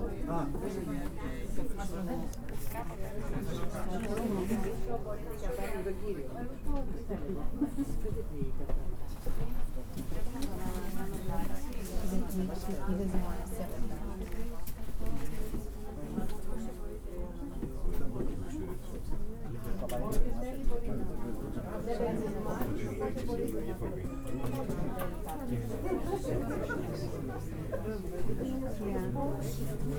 Soundscapes > Indoors
Audience taking seats before a theatre performance
Audience talking while taking their seats before a theatre performance. Indoors small venue. This a Greek audience but what they saying is hardly understandable. Its very difficult (and highly improble) to understand what they are saying even if you are a native speaker of Greek. So, this is very useful for use as a small crowd of any language. Recorded with a Tascam DR-05X portable mini recorder.
Audience, crowd, people, small-crowd, talking, voices